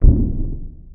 Sound effects > Electronic / Design
Previous Tag means it is not a mature sound I made. Sample used with signaturesounds 130 sound banks. Processed with phaseplant sampler, ZL EQ, Waveshaper, Camel Crusher, Khs Phase Distortion and Phaser.